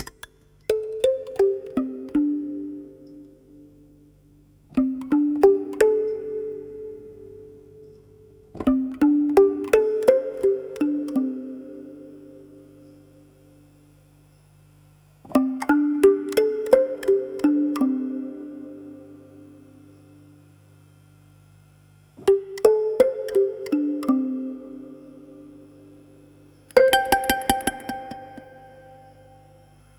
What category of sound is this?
Music > Multiple instruments